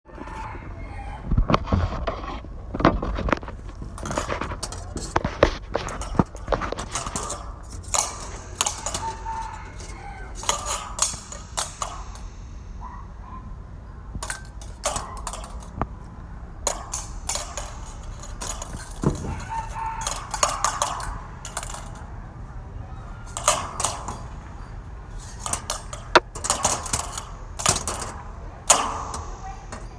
Sound effects > Objects / House appliances
Dây Chì Gõ Ống Thép Hình Chữ Nhật Dài 2 - Wire Hit Steel Pipe
Wire hit long metal pipe. Use iPhone 7 Plus smart phone 2025.12.30 17:03